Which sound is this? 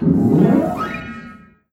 Music > Solo instrument
MUSCKeyd-Samsung Galaxy Smartphone, CU Grand Piano, Gliss Up, Low Nicholas Judy TDC
A low grand piano gliss up. Recorded at The Arc.
gliss, grand-piano, Phone-recording, up